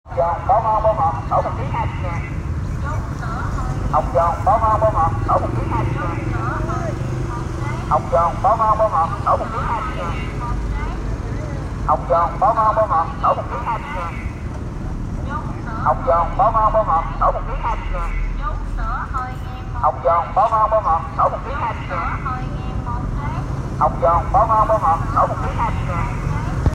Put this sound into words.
Speech > Solo speech
Hồng Giòn Bao Ngon Bao Ngọt Mỗi 1 Ký 20 Ngàn
Man sell hồng say 'Hồng giòn bao ngon, bao ngọt, mỗi 1 ký 20 ngàn. Reoord use iPhone 7 Plus smart phone 2026.01.14 17:05
business, fruit, male, man, sell, viet, voice